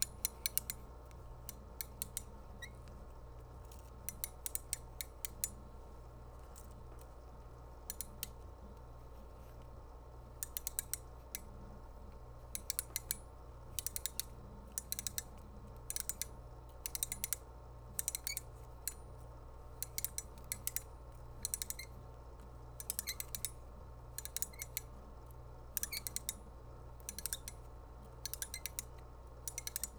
Sound effects > Other mechanisms, engines, machines
MECHRtch-Blue Snowball Microphone Winch, Wind Up, Squeaky, Mechanical Nicholas Judy TDC
A mechanical winch winding up with some squeaking.
squeak, Blue-brand, Blue-Snowball, mechanical, foley, wind-up, winch, squeaky